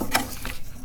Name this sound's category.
Sound effects > Other mechanisms, engines, machines